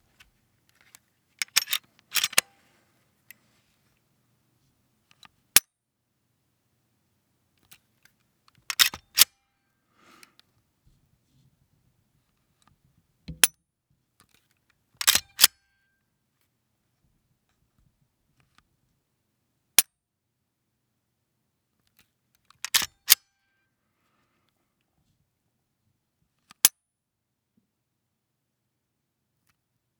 Sound effects > Other mechanisms, engines, machines
Glock17 Trigger
Contact with and pulling of a Glock 17's trigger. GLOCK 17 TRIGGER
Firearm, Gun, Pistol, Weapon